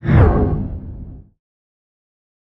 Sound effects > Other
Sound Design Elements Whoosh SFX 025
trailer, film, audio, fx, whoosh, sound, ambient, design, motion, swoosh, cinematic, effects, production, effect, movement, transition, element, sweeping, dynamic, elements, fast